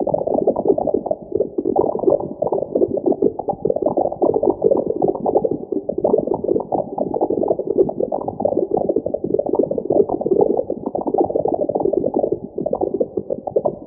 Soundscapes > Nature
Human Drops Water(Bubble 1)
Hi ! That's not recording sound :) I synth them with phasephant!
bubble, Droped, Drown, Water